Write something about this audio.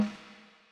Music > Solo percussion
Snare Processed - Oneshot 132 - 14 by 6.5 inch Brass Ludwig
realdrum, reverb, snareroll, drumkit, oneshot, rim, rimshot, drums, acoustic, snares, crack, rimshots, snaredrum, beat, hits, roll, perc, drum, sfx, ludwig, hit, processed, percussion, fx, brass, snare, realdrums, kit, flam